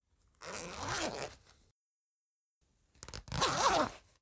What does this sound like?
Sound effects > Objects / House appliances
Opening & closing zipper on a bag
Opening and closing the zipper on a small bag. Made by R&B Sound Bites if you ever feel like crediting me ever for any of my sounds you use. Good to use for Indie game making or movie making. This will help me know what you like and what to work on. Get Creative!
closing, zipper, open, close, bag, opening